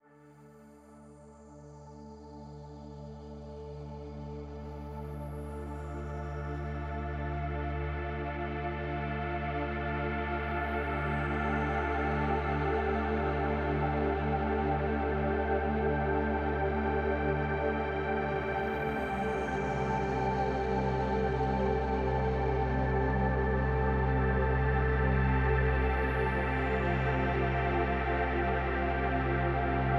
Soundscapes > Synthetic / Artificial
Calm... Melodic ambient serenity. Drift away with beautiful, expansive pads. It can be ideally used in your projects. Not used ai-generated.